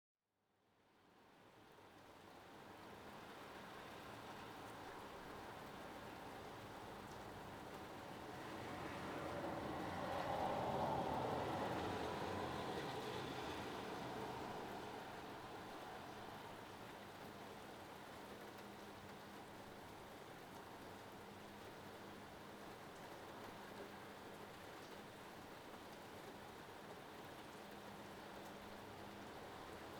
Soundscapes > Urban
A evening recording from a semi-open window perspective.
ambience, cars, field-recording, gentle-wind, rain, resedential